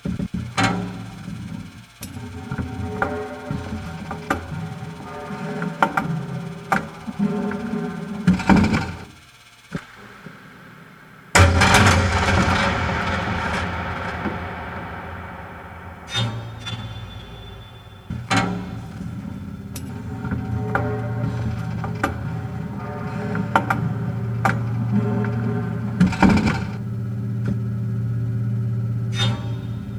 Sound effects > Objects / House appliances
Konkret Jungle 4
From a pack of samples focusing on ‘concrete’ and acousmatic technique (tape manipulation, synthetic processing of natural sounds, extension of “traditional” instruments’ timbral range via electronics). This excerpt is mainly based upon the sounds of metal and wooden hand tools striking metal storage racks, with additional "unwanted" sonic ephemera left in for effect. Original recordings were re-edited / randomized and heavy reverberation was added courtesy of ALM / Busy Circuits' MFX module.
MFX, objet-sonore, reverberation, musique-concrete, extended-technique, acousmatic, metal-racks